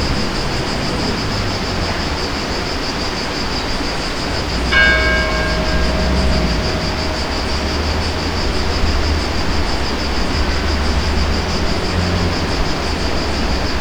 Soundscapes > Urban
250710 20h30 Esperaza Bell Quai Jean Jaurès
Subject : Recording the church bells from Quai Jean Jeaurès. The bells ring one time at the half hour. Sennheiser MKE600 with stock windcover P48, no filter. Weather : Clear sky, little wind. Processing : Trimmed in Audacity.